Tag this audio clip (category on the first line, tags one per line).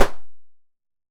Sound effects > Natural elements and explosions
32
32float
Balloon
Data
float
High
Impulse
IR
Measuring
Pop
Quality
Response